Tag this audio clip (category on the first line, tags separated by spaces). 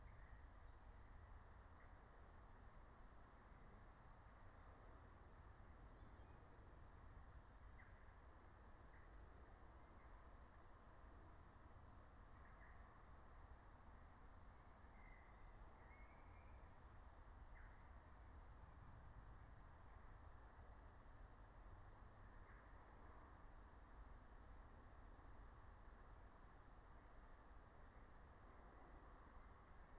Soundscapes > Nature
phenological-recording meadow nature natural-soundscape field-recording raspberry-pi alice-holt-forest soundscape